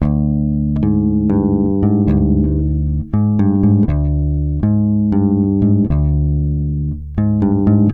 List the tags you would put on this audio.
Instrument samples > String
charvel
fx
oneshots
bass
electric
rock
mellow
slide
pluck
loop
plucked
blues
riffs
loops
funk

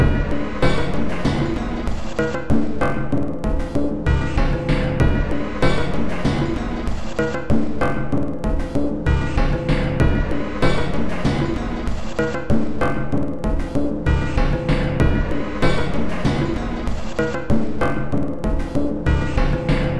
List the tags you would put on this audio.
Instrument samples > Percussion
Drum
Loopable
Industrial
Samples
Loop
Soundtrack
Weird
Alien
Underground
Ambient
Packs
Dark

